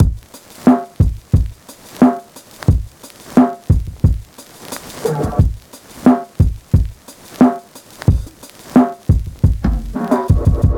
Music > Solo percussion
bb drum break loop derby 89
A short set of Acoustic Breakbeats recorded and processed on old tape. All at 89 BPM
Vintage, Drum-Set, Breakbeat, DrumLoop, Drums, Break, Drum, 89BPM, Lo-Fi, Acoustic, Dusty, Vinyl